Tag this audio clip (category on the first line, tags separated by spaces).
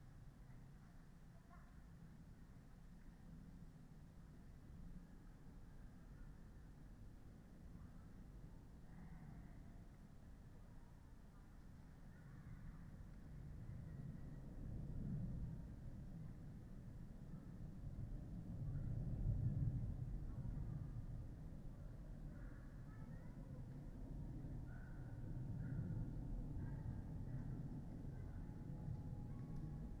Soundscapes > Nature
Dendrophone field-recording